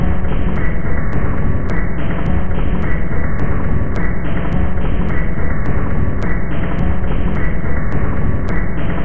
Instrument samples > Percussion
This 212bpm Drum Loop is good for composing Industrial/Electronic/Ambient songs or using as soundtrack to a sci-fi/suspense/horror indie game or short film.
Drum, Loopable, Underground, Weird